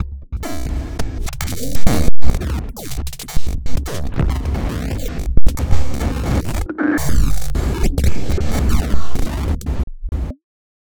Sound effects > Electronic / Design
Electroglitxxch - FX
A tripped out electrical glitch sound created using a myriad of analog and digital synths and vst effects. Created in FL Studio and Reaper
abstract, alien, analog, digital, effect, electric, electricity, electronic, freaky, future, fx, glitch, glitchy, noise, otherworldly, random, sci-fi, scifi, sfx, sound-design, sounddesign, soundeffect, strange, synth, synthetic, trippy, weird, wtf